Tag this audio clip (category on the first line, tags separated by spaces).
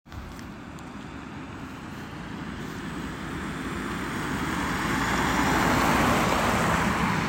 Sound effects > Vehicles
car tampere